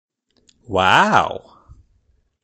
Speech > Solo speech
I exclaim "Waow!".